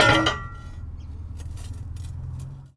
Sound effects > Objects / House appliances
Junkyard Foley and FX Percs (Metal, Clanks, Scrapes, Bangs, Scrap, and Machines) 60
garbage, FX, Ambience, Smash, Perc, rattle, trash, Robotic, dumpster, Foley, SFX, Junk, Clang, Dump, Junkyard, rubbish, Percussion, Bash, Metallic, Machine, Metal, Atmosphere, Clank, Robot, scrape, waste, Environment, dumping, tube, Bang